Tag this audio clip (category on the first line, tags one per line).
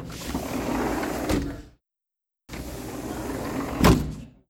Sound effects > Objects / House appliances
open
patio
slide
door
foley
Phone-recording
sliding
close